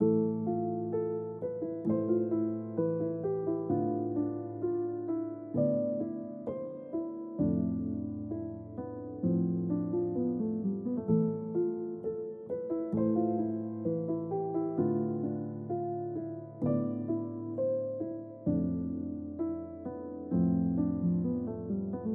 Music > Solo instrument
Looping Piano Melody
Loopable piano melody made with Spitfire LABS.
clean, loop, music, piano